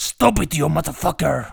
Speech > Solo speech
stop it you motherfucker
enemy, male